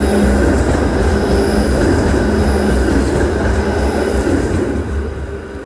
Sound effects > Vehicles
tram sunny 09
motor, sunny, tram